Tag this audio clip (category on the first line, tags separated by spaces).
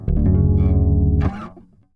Music > Solo instrument
bass bassline basslines blues chords chuny electric electricbass funk fuzz harmonic harmonics low lowend note notes pick pluck riff riffs rock slap slide slides